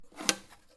Sound effects > Other mechanisms, engines, machines
Woodshop Foley-047
bam, bang, boom, bop, crackle, foley, fx, knock, little, metal, oneshot, perc, percussion, pop, rustle, sfx, shop, sound, strike, thud, tink, tools, wood